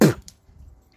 Sound effects > Human sounds and actions
HMNSpit-Samsung Galaxy Smartphone, CU Concrete Nicholas Judy TDC
Spit on concrete.